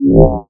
Instrument samples > Synths / Electronic
DISINTEGRATE 2 Db
bass, fm-synthesis, additive-synthesis